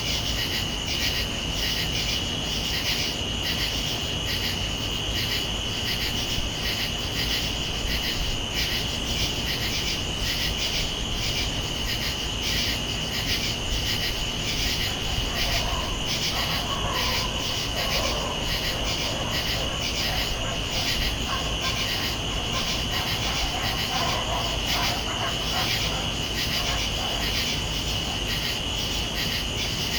Soundscapes > Urban
AMBSubn-Summer Hot Summer Night in Backyard, cicadas, crickets, midnight QCF Cincinnati Ohio Sony D100
Hot summer night in the neighborhood - cicadas, crickets, dogs, passing traffic, AC fan hum. Midnight, late July, Cincinnati, Ohio
neighborhood, summer